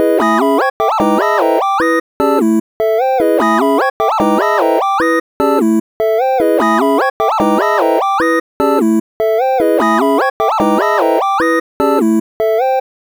Music > Other
You can think of this sound/music as an industrial alarm, or an "error" sound. Or simply as if someone had destroyed a device and then it played this kind of "alarm."
16-bits, 8-bit, Game, Retro, Sountrack, Video, Videojuego